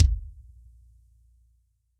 Instrument samples > Percussion
Kickdrum sample ready to use in the Roland TM-2. This is an own recorded sample.